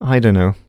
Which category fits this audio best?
Speech > Solo speech